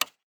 Sound effects > Human sounds and actions
A clean, mechanical switch sound featuring a quick click followed by a subtle snap, ideal for toggles, buttons, or power controls.
off, click, switch, interface, toggle, activation, button